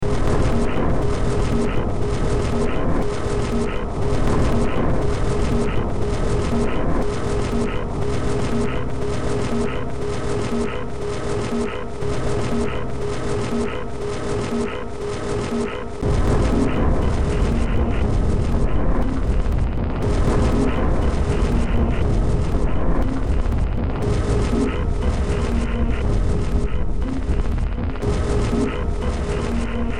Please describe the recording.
Music > Multiple instruments
Demo Track #2935 (Industraumatic)
Ambient, Cyberpunk, Games, Horror, Industrial, Noise, Sci-fi, Soundtrack, Underground